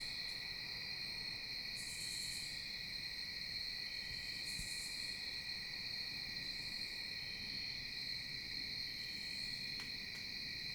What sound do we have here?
Soundscapes > Nature
Crickets and a Light Wind
Sound of Crickets along with a very light Wind in the background. Recorded with a ZOOM H6 and a Sennheiser MKE 600 Shotgun Microphone. Go Create!!!
Wind, Night, Ambience, Breeze, Nature, Crickets